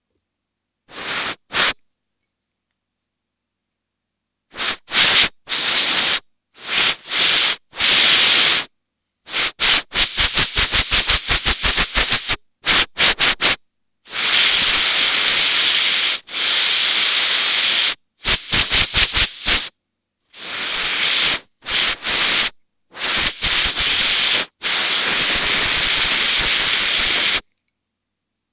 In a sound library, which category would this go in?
Sound effects > Objects / House appliances